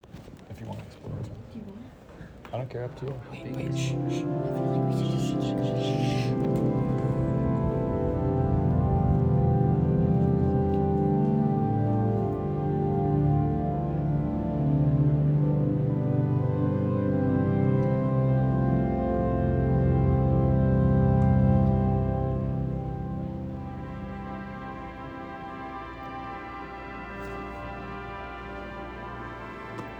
Music > Solo instrument
Recorded using my iPhone 13, the sounds of an unknown church in Venice. Don't mind the shushing at the beginning.
Church-Organ, Echo, Organ, Venice